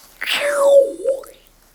Sound effects > Objects / House appliances
weird blow and whistle mouth foley-003
natural, industrial, mechanical, oneshot, object, metal, glass, hit, fieldrecording, foundobject, fx, clunk, bonk, stab, percussion, perc, sfx, foley, drill